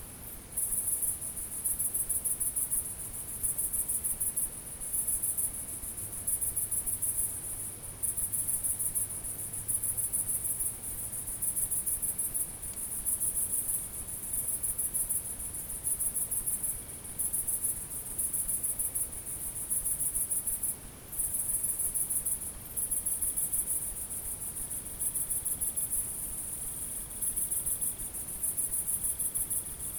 Soundscapes > Nature
Subject : Ambience recording of Gergueil, at the start of "Brame" season (Stags shouting). My uncle saw some that boars digged a fair bit around fields and so I was trying to record that. Date YMD : 2025 September 09 19h53 Location : Gergueil 21410 Bourgogne-Franche-Comté Côte-d'Or France. GPS = 47.23784608300959, 4.822730587340072 Facing NW. Hardware : Zoom H2n with a sock as a windcover. Held up in a tree using a Smallrig magic arm. Weather : Processing : Decoded the RAW MS, removing 1 gain on side channels. Trimmed and normalised in Audacity. Notes : That night, I recorded with 4 microphones around the village.